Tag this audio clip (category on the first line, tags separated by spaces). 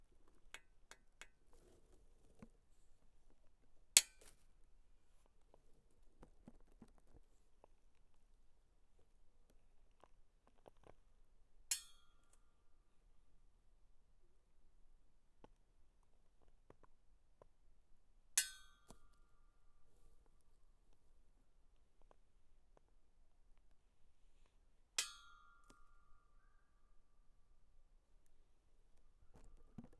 Instrument samples > Percussion
chime ring bell ding ringing